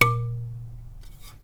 Music > Solo instrument
Marimba Loose Keys Notes Tones and Vibrations 18-001
keys, wood, tink, oneshotes, rustle, thud, woodblock, notes, marimba, foley, loose, fx, perc, block, percussion